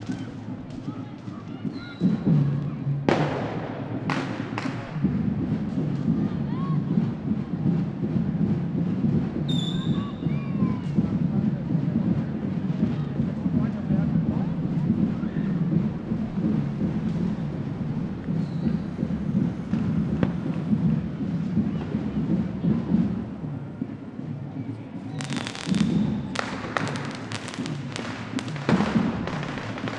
Soundscapes > Urban
Sant Joan, Summer Solstice in Barcelona, June 2023
This is a recording of Sant Joan day in Barcelona (June 2023), which reminded me of Chaharshanbe Souri, the Iranian fire-jumping tradition. These traditions are almost identical to each other. Listening to the new home.
Iran, Fireworks, Solstice, Barcelona, Chaharshanbeh-Souri, Diasporic, Catalunya